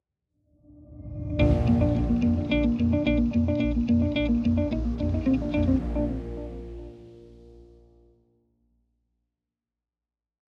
Music > Other
Relaxing intro/outro
This audio file was made, recorded and processed in DAW using only my samples, synth presets and items; - Calm and short melody/sound effect for whatever idea you have, but personally i made this thing to be used in the beginning or the end of some video or videogame. - Made with mixed recordings of my electric guitar through the audio interface, some synths and automated noises on top of that. :-) - Ы.
beginning, delay-guitar, plucky